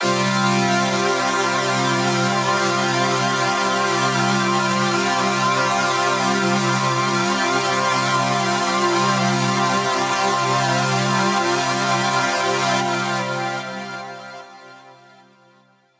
Soundscapes > Synthetic / Artificial
Ambient 2 C-G-F Chord
Synthed with Vital only. I parallelly linked it to 2 channel, first channel put a Vocodex and set ''PWM'' as carrier, second channel put Vocodex too but ''Organ'' is its carrier, finally I layered them both. Final Process just used a ZL EQ to boost F3 frequency. To use it better, just drag it into your Flstudio sampler, select ''stretch pro'' as its stretch mode, and set format to -200 cent. Volume ENV can set as below: Hold: 0% ATT: 0% DEC: 0% SUS: 100% Release: 21.8518857844174% Release tension: 10.546875% Try to play it in 90-110 bpm with A4-F5 key range is better.
Ambient, analog, Atomosphere, Pad, synthwave